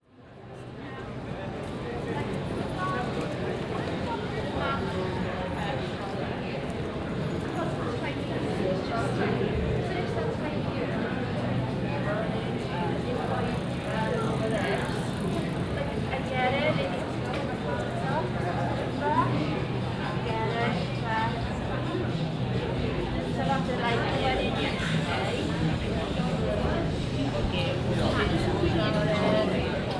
Soundscapes > Urban
Cardiff - Church St, Outside The Market
cardiff, city, citycentre, fieldrecording